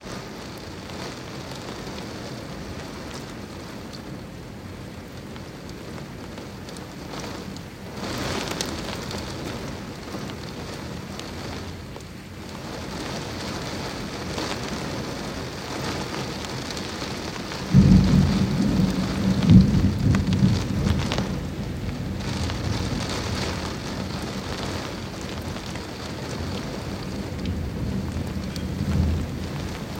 Sound effects > Natural elements and explosions
This is an interior recording made by a window of a rainstorm with occasional thunder.